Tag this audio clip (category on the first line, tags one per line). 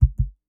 Sound effects > Objects / House appliances
button
keyboard
office
laptop